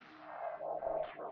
Soundscapes > Synthetic / Artificial
LFO Birdsong 27
birds, lfo, massive